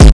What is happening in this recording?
Instrument samples > Percussion
Sample used from bandlab. Processed with waveshaper.